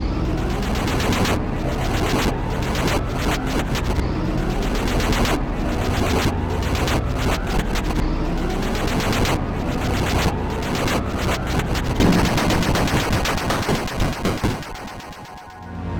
Sound effects > Electronic / Design
Weird power explosion thing
Some kind of.................. Thing. I really don't know how to describe this but it is some kind of charging power magical explosion power explosion magic blast thing. I used this for an animation in which the character creates an explosion using his psychic powers
blast, charge, magic